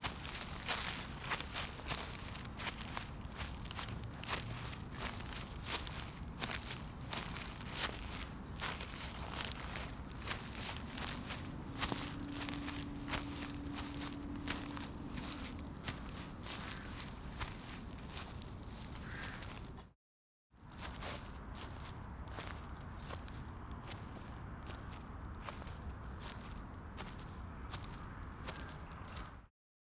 Sound effects > Human sounds and actions
Footsteps, Human, Leaves, Sidewalk, Sneakers, Ambience, Wind, Birds, Walk, Run
Recorded with a Tascam DR-05X. Thank you!
Human, Run, Birds, Ambience, Footsteps